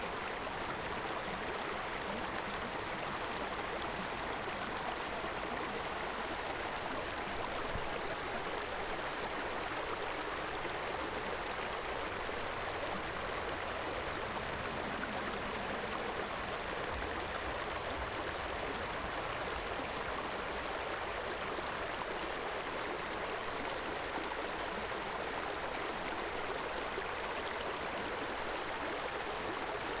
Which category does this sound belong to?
Soundscapes > Nature